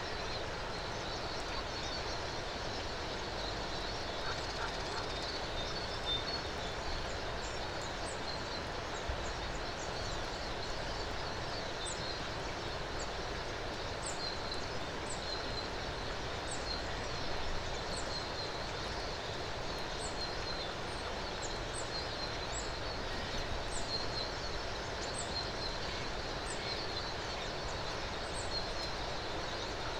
Soundscapes > Nature
Beginning of March
See: that what happens if you don´t keep your recording diary up-to-date. So what I can tell you is, that the recording was done on the 5th of March 2023 (roll on springtime) and that it might have been a flock of waxwings. Sony PCM-D50
birdsong, field-recording, march, spring, waxwings